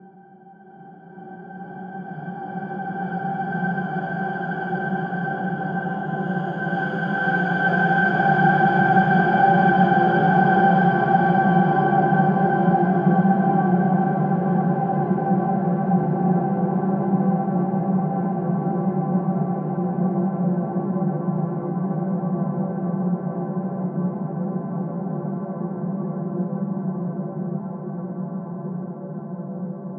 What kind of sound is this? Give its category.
Soundscapes > Synthetic / Artificial